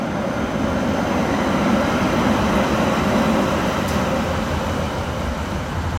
Soundscapes > Urban
testaudio3 hel
Electric tram operating on metal rails. High-pitched rail friction and metallic wheel screech, combined with steady electric motor hum. Rhythmic clacking over rail joints, bell or warning tone faintly audible. Reflections of sound from surrounding buildings, creating a resonant urban atmosphere. Recorded on a city street with embedded tram tracks. Recorded on iPhone 15 in Helsinki. Recorded outdoors at a tram stop on a busy urban street. Used for study project purposes.
helsinki, tram, urban